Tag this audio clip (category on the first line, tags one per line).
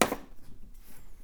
Sound effects > Other mechanisms, engines, machines
thud
tools
little
bam
shop
sfx
foley
strike
bang
fx
wood
boom
sound
bop
crackle
tink
oneshot